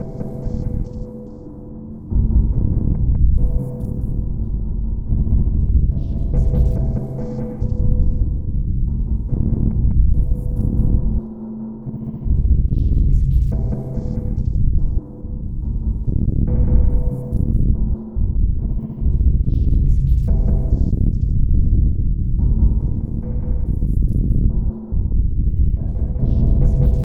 Instrument samples > Percussion
This 71bpm Horror Loop is good for composing Industrial/Electronic/Ambient songs or using as soundtrack to a sci-fi/suspense/horror indie game or short film.
Drum, Ambient, Industrial, Samples, Underground